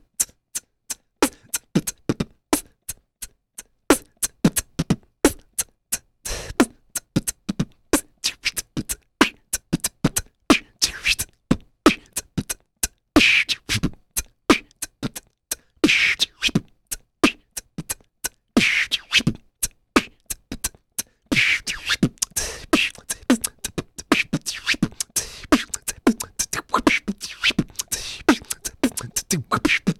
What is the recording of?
Music > Solo percussion
Unprocessed, unedited beatbox freestyle session